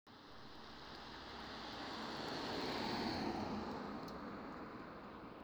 Sound effects > Vehicles
tampere car7
car passing by near Tampere city center
automobile, vehicle, car